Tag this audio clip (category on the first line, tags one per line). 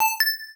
Sound effects > Electronic / Design
alert
button
Digital
Interface
menu
message
notification
options
UI